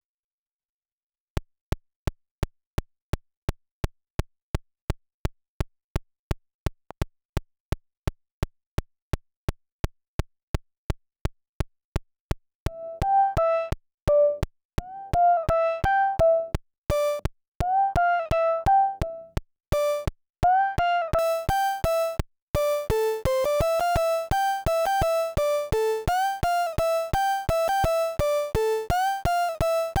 Music > Solo instrument
Po-128 collab project (With FX)
A old project of collabing with someone with pocket operators. It never flourished, maybe it will now :) Left side is a sync track. Right track is the actual tune. 4 Bars intro 4 Bars theme 1 2 Bars breaking down/switching 4 Bars theme 2 4 Bars theme 1 4 Bars theme 1.2 (extra hype and performance) End or outro. BPM is 85. I don't know what key it is in, Minor something, A minor? If you're using a pocket operator as well, you'l probably need to put it in SY4 or SY5 sync mode. There's an alternate version
Stem, Dare2025-06B, po-128, Pocket-Operator, Collab